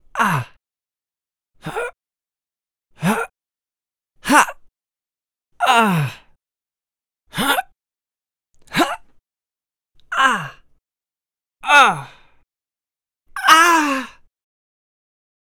Sound effects > Human sounds and actions
Soft Male Vocal, Short Pained/Exhertion Groans - Vocal Efforts
recording of my own voice via a Neat King Bee v1 going into an Audient Evo 4 about 10 different variations of short grunts, sighs, etc, i tried to include a 'finisher' type KO sound at the end! credit and letting me know about project uses is awesome, but not necessary!
human, voice, efforts, vocal, male